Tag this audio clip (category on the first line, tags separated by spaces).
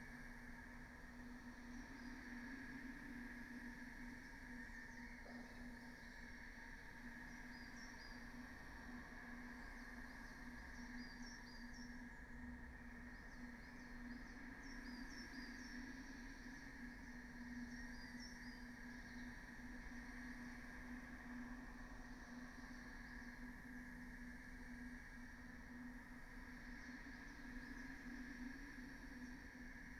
Soundscapes > Nature
Dendrophone raspberry-pi artistic-intervention data-to-sound soundscape phenological-recording natural-soundscape alice-holt-forest weather-data modified-soundscape sound-installation nature field-recording